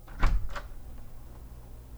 Sound effects > Objects / House appliances
Door Open 01
door, opening, house